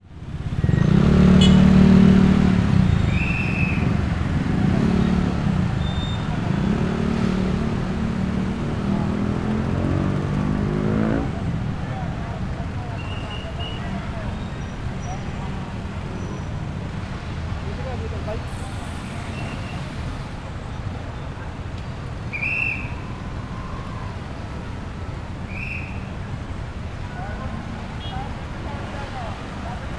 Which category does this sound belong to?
Soundscapes > Urban